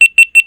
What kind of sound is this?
Sound effects > Electronic / Design
3 consecutive beeps (GoPro)
This is the sound when the GoPro is booting up. Software: Audacity Microphone: Blue Yeti Nano Premium
beeping, camera, gopro